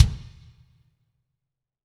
Instrument samples > Percussion
kick simple 2023 1
a kick = a bass drum The Tama Starclassic Walnut/Birch kick blended with a trigger. (The standalone/untriggered Tama Starclassic Walnut/Birch kick is warmer = less bright but superior. Please remind me to upload it.)
bass, bass-drum, bassdrum, beat, death-metal, drum, drums, groovy, hit, kick, mainkick, metal, percussion, pop, rhythm, rock, thrash, thrash-metal